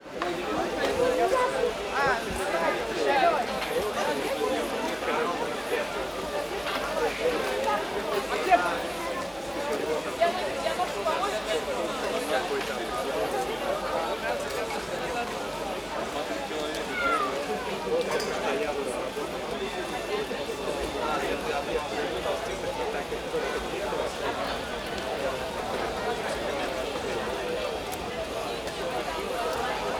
Soundscapes > Nature
AMBRest-XY Zoom H4e Mess Area Of Admin Camp-Talkings in Mess Area SoAM Piece of Insomnia 2025
Fade In\Out 0.5 sec, Low Shelf about -6Db A Piece of Insomnia 2025 This is a small field recording library capturing a day in the life of volunteers and attendees at one of the world's most renowned international animation festivals. All audio was recorded on a single day—July 20, 2025. Immerse yourself in the atmosphere of a digital detox: experience how the festival's participants unwind far from the urban hustle, amidst vast fields and deep forests, disconnected from the internet and cellular networks. Кусочек «Бессонницы» 2025 Это маленькая шумовая библиотека, состоящая из полевых записей, запечатлевшая один день из жизни волонтёров и посетителей одного из самых известных в мире международных фестивалей анимации. Все аудиодорожки были записаны в один день — 20 июля 2025 года. Погрузитесь в атмосферу цифрового детокса: услышьте, как участники фестиваля отдыхают вдали от городской суеты, среди бескрайних полей и густых лесов, в отрыве от интернета и сотовой связи.